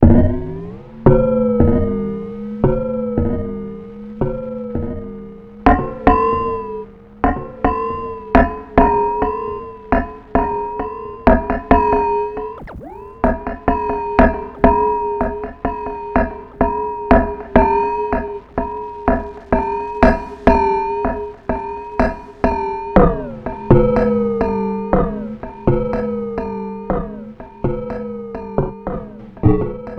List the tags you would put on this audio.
Soundscapes > Synthetic / Artificial
fork
hit
loop
tape

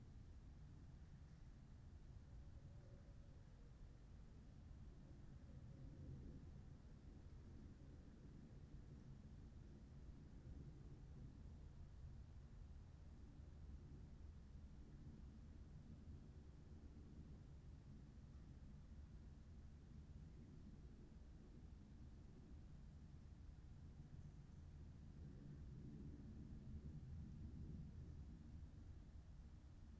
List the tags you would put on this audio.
Soundscapes > Nature
artistic-intervention
field-recording
soundscape

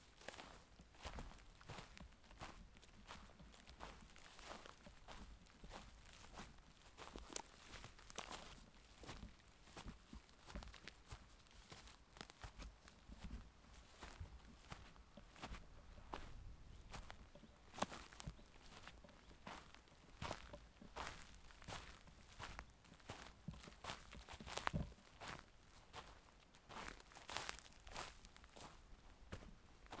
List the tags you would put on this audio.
Soundscapes > Nature
ambience
birds
footsteps
forest
nature
outdoor
path
walking

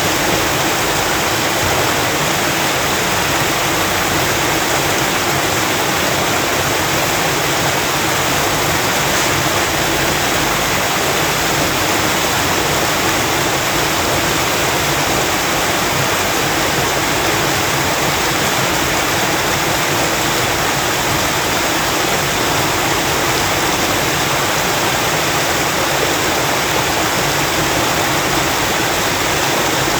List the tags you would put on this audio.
Soundscapes > Urban
ambience
Banassac
field-recording
Phone-recording
river
water

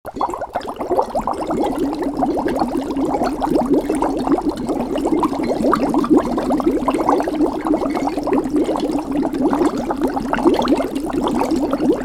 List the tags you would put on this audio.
Sound effects > Other
beaker
bubbling
chemicals
fluid
laboratory
water

